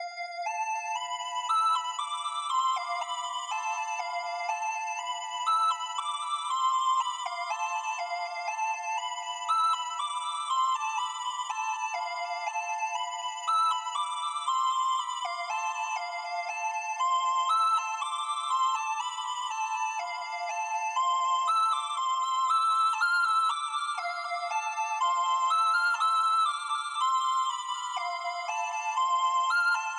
Music > Solo instrument
peace synth 120bpm 1lovewav
music, electro, synth, electronic, loop, 120bpm